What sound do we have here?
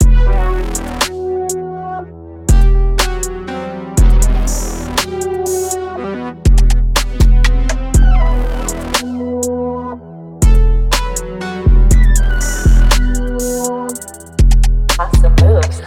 Music > Multiple instruments
Dark trap beat, inspired in star wars aesthetics and synths. Part of a whole beat. AI generated: (Suno v4) with the following prompt: generate a dark and aggressive beat, with intense percussion and bass 808 and inspired in star wars synths and other-worldly sounds. Do it in 120 BPM and B minor.